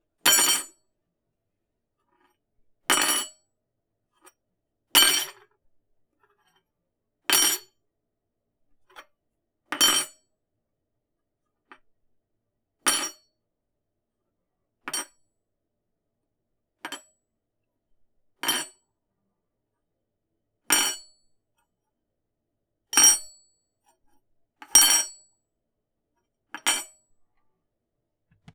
Sound effects > Objects / House appliances
Picking up and dropping a metal spoon on a countertop.